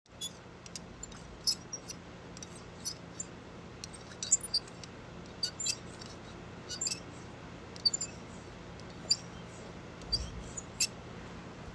Sound effects > Experimental
Fork slow
plastic forks scraping together to make creepy sound
scraping, haunted, creepy, forks, scary